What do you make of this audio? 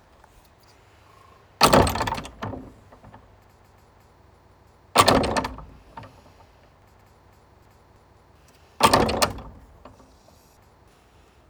Sound effects > Objects / House appliances
Open Window Banging In Wind
This is the sound of either a window or gate banging in the wind. It was recorded at my house using an iPhone 16 and the Rode Reporter app on Oct. 13, 2025.
banging, gate, weather, music, window, storm, wind